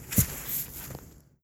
Sound effects > Human sounds and actions
FGHTBf-Samsung Galaxy Smartphone, CU Bodyfall, On Grass Nicholas Judy TDC
A bodyfall on grass.
Phone-recording, foley, grass